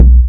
Instrument samples > Percussion
Afrotom weak 1
The distortions aren't audible in extreme music. With WaveLab 11 restoration you can totally unclick all files, but you have to re-attach the original attack. I compose extreme music thus I have many overboosted files.
Africa, African, ashiko, bass, bougarabou, bubinga, death, death-metal, drum, drumset, dundun, dundunba, DW, floor, floortom, heavy, heavy-metal, kenkeni, metal, ngoma, overboosted, rock, sangban, sapele, Tama, thrash, thrash-metal, tom, tom-tom, unsnared